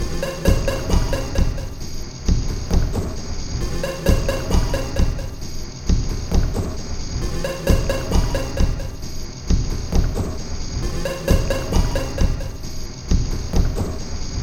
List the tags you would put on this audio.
Instrument samples > Percussion
Soundtrack Weird Packs Underground Loop

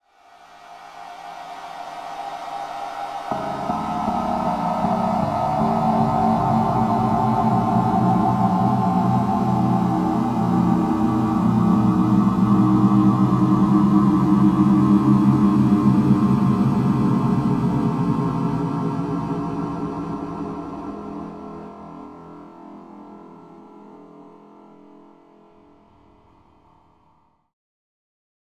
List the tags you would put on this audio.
Soundscapes > Synthetic / Artificial
alien ambience ambient atmosphere bass bassy dark drone effect evolving experimental fx glitch glitchy howl landscape long low roar rumble sfx shifting shimmer shimmering slow synthetic texture wind

